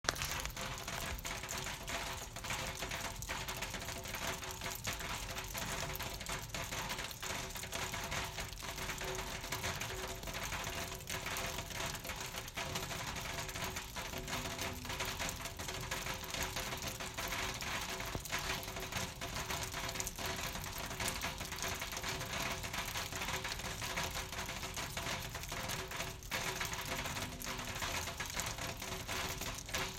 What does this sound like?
Soundscapes > Nature
Annual apple harvest , sound of apple crusher .